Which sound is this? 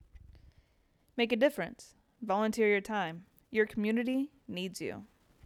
Speech > Solo speech
PSA – Volunteer in Your Community
A motivating PSA encouraging people to volunteer and strengthen their communities. Script: "Make a difference. Volunteer your time. Your community needs you."
CommunityLove, GiveBack, MakeADifference, PSA, PublicService, SupportLocal, Volunteer